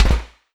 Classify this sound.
Sound effects > Electronic / Design